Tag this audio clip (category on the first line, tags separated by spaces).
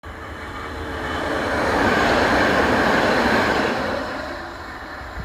Soundscapes > Urban
field-recording railway Tram